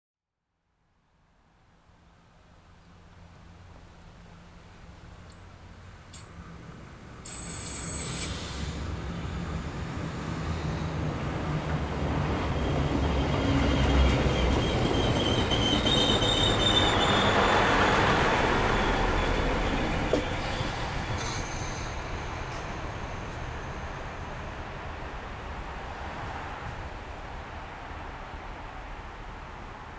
Soundscapes > Urban
Recording of an old passenger train with mechanical clatter, rhythmic wheel sounds and atmospheric rail ambience. Recorded on Zoom H4n Pro (stock mic) Main sound recorded at: #0:10 train going towards station (end of railway) #1:00 same train going back
old, sounds, ambience, vintage, railway, field, industrial, soundscape, passenger, passing, railroad, recording, train, journey, tracks, transportation, wheels, environment, travel, mechanical, locomotive, transport